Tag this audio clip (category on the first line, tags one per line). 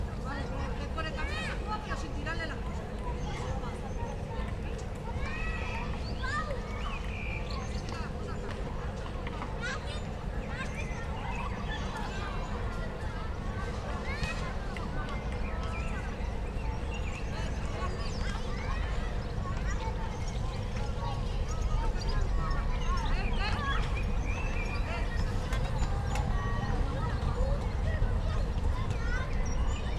Soundscapes > Nature
birds
kids
park
playground